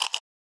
Sound effects > Objects / House appliances
Matchsticks ShakeBox 2 Shaker
Shaking a matchstick box, recorded with an AKG C414 XLII microphone.